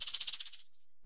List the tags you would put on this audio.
Instrument samples > Percussion
sampling
recording

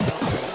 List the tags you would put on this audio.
Sound effects > Electronic / Design
digital
glitch
hard
one-shot
pitched
rhytmic

